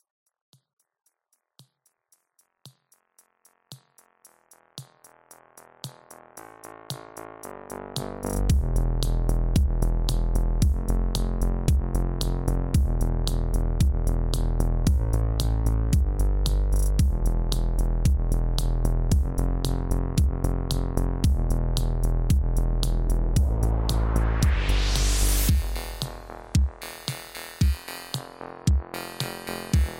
Music > Multiple instruments
120bpm elctronic beat
120bpm; synth; EDM